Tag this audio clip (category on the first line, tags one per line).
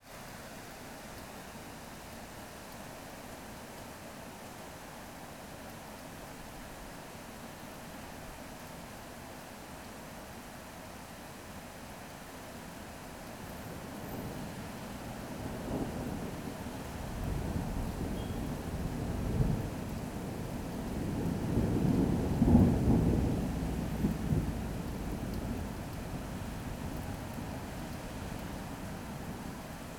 Soundscapes > Nature
cars
storm
trees
Georgia
thunder